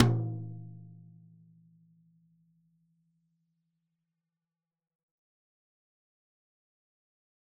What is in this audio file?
Music > Solo percussion
Med-low Tom - Oneshot 4 12 inch Sonor Force 3007 Maple Rack
acoustic, beat, drum, drumkit, drums, flam, kit, loop, maple, Medium-Tom, med-tom, oneshot, perc, percussion, quality, real, realdrum, recording, roll, Tom, tomdrum, toms, wood